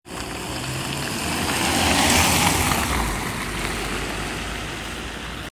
Sound effects > Vehicles
car rain 10
engine, vehicle, rain, car